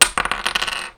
Sound effects > Objects / House appliances
FOLYProp-Blue Snowball Microphone, CU Seashell, Clatter 07 Nicholas Judy TDC
A seashell clattering.
Blue-brand, Blue-Snowball, clatter, foley, seashell